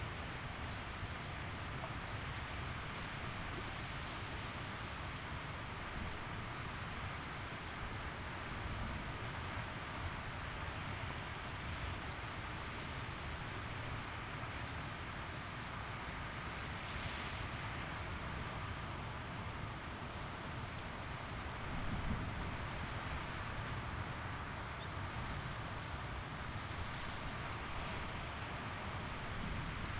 Soundscapes > Nature
Stonechat, Skylar, Linnet, Meadow Pipit togehter @ Fast Castle
On the way down to Fast Castle, 4 species of bird sing and call over the ebb and flow of north sea below. 14/04/2025 Record with Tascam DR-05x